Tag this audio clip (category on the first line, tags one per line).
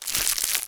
Sound effects > Animals
eating
insect
bite
chewing
spider